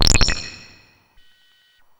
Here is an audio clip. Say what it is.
Instrument samples > Synths / Electronic
Benjolon 1 shot3
1SHOT, CHIRP, DRUM, MODULAR, NOISE, SYNTH